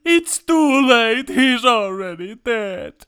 Speech > Solo speech
its too late he is allready dead
dead, crying, male, voice, horror